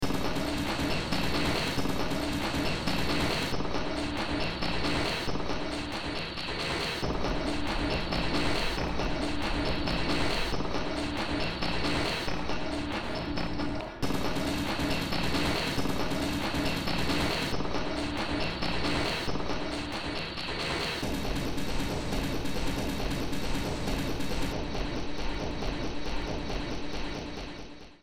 Music > Multiple instruments
Short Track #3985 (Industraumatic)
Ambient, Cyberpunk, Games, Horror, Industrial, Noise, Sci-fi, Soundtrack, Underground